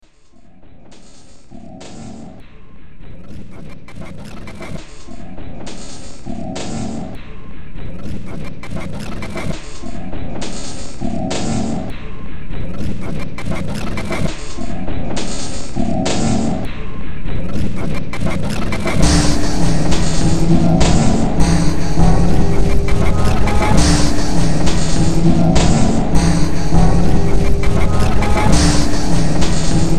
Music > Multiple instruments
Demo Track #3404 (Industraumatic)
Industrial, Cyberpunk, Horror, Ambient, Soundtrack, Sci-fi, Noise, Underground, Games